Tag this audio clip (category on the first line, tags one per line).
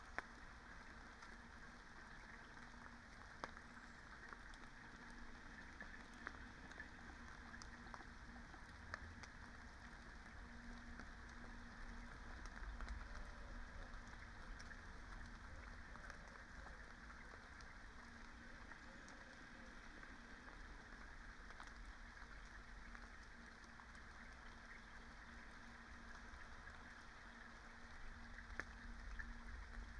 Soundscapes > Nature
artistic-intervention
soundscape
sound-installation